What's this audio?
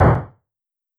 Sound effects > Human sounds and actions
Footstep Gravel Running-08
Shoes on gravel, running. Lo-fi. Foley emulation using wavetable synthesis.